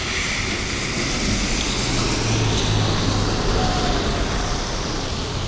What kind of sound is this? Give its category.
Sound effects > Vehicles